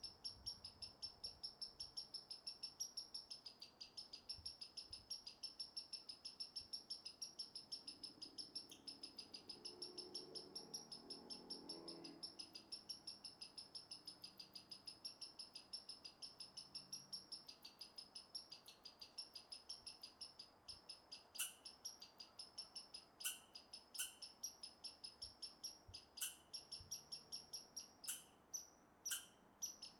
Soundscapes > Nature
Backyard squirrel
A red squirrel makes some noise on top of a fence in a backyard in the Riverdale neighbourhood in Whitehorse, Yukon on a quiet August night. This is a VERY familiar sound to anyone who lives in the area. At one point, it sounds like there are actually TWO squirrels, but only one was visible. Maybe it had magical vocal powers. Recorded on a Zoom H2n in 150-degree stereo mode.
squirrels, outdoor, whitehorse, chatter, red-squirrel, riverdale, field-recording, yukon